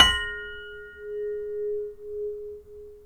Sound effects > Other mechanisms, engines, machines
metal shop foley -075
bam, bang, boom, bop, crackle, foley, fx, knock, little, metal, oneshot, perc, percussion, pop, rustle, sfx, shop, sound, strike, thud, tink, tools, wood